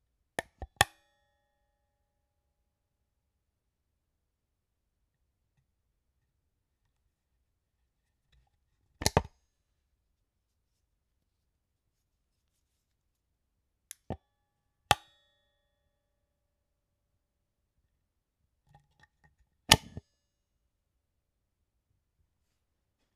Sound effects > Objects / House appliances
Knipex 41 04 180 locking pliers (Wood bite)
Subject : Recording a Knipex 41 04 180 locking pliers / vice grip. Here, it's biting on a wooden chopping board. Date YMD : 2025 July 07 Location : Indoors. Sennheiser MKE600 P48, no filter. Weather : Processing : Trimmed and maybe sliced in Audacity.
locking-pliers, mke600, fr-av2, close-up, knipex, vice-grip, Sennheiser, tool, pliers, hardware, biting-wood, locking, indoor, mke-600, wood, tascam